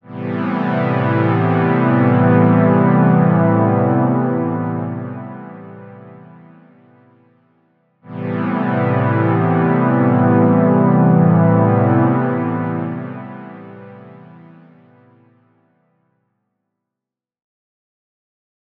Instrument samples > Synths / Electronic
Simple chords with Rolands JD800 in aminor